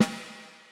Music > Solo percussion
Snare Processed - Oneshot 216 - 14 by 6.5 inch Brass Ludwig
sfx, brass, processed, rim, oneshot, rimshots, drumkit, hit, snareroll